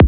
Instrument samples > Percussion
A kick retouched from Flstudio original sample pack: Filtered Kick. Just tweak a lot of pogo and pitch amount. Then saturated and Compressed with Fruity Limiter and Waveshaper. EQ with ZL EQ.